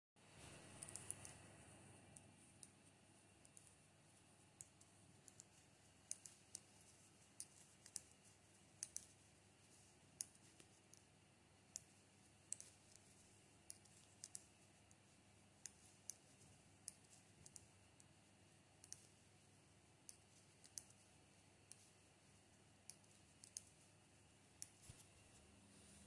Soundscapes > Indoors
A short clip of the sound of knitting over a boiling pot in the background. Recorded with a Motorola Edge 50.